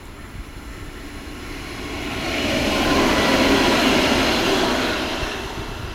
Sound effects > Vehicles
Tram 2025-10-27 klo 20.13.01
Finland, Public-transport, Tram